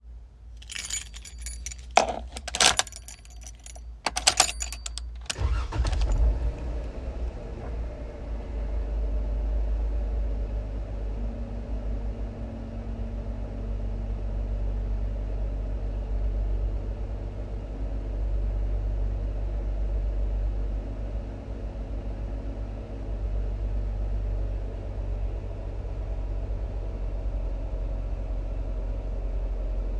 Sound effects > Vehicles
Jeep Wrangler Engine Start 2
Jeep Wrangler Sahara engine start, run and stop. Sound of keys being inserted/removed as well.
Vehicle, Engine, Auto, Jeep, Start, Wrangler, Stop, Car